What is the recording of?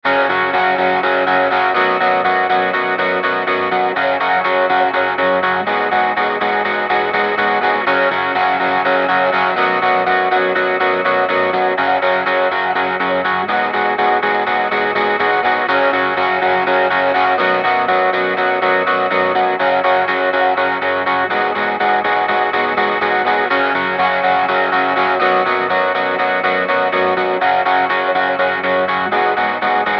Music > Solo instrument
Guitar loops 112 05 verison 05 61.4 bpm
Elilectric guitar distorded . The VST plugin cybercore drive was used for the fuzz effect This sound can be combined with other sounds in the pack. Otherwise, it is well usable up to 4/4 61.4 bpm.
simplesamples, guitar, bpm, samples, reverb, electricguitar, loop, simple, free, electric, music, pianomusic